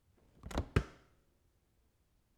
Sound effects > Objects / House appliances
250726 - Vacuum cleaner - Philips PowerPro 7000 series - Pulling dust bucket out
Subject : A sound from my pack of my brand spanking new Philips PowerPro 7000 series vacuum cleaner. Date YMD : 2025 July 26 Location : Albi 81000 Tarn Occitanie France. Sennheiser MKE600 with P48, no filter. Weather : Processing : Trimmed and normalised in Audacity.
cleaner, Hypercardioid, Tascam, FR-AV2, Single-mic-mono, Vacum, Shotgun-mic, Powerpro-7000-series, MKE600, vacuum, vacuum-cleaner, 7000, MKE-600, aspirateur, Sennheiser, Powerpro, Shotgun-microphone